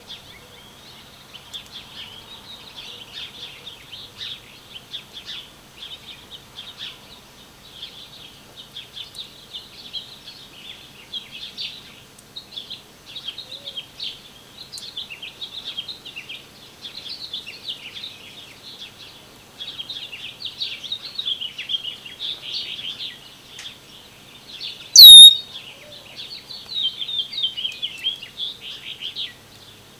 Soundscapes > Nature
Birds at Feeder Montrose Colorado
Recording of birds flying around backyard feeder. Sounds of wings flapping, seeds being eaten, occasional bees. Much chirping. Zoom H4nPro
birds, nature, feeding, birdsong, field-recording, spring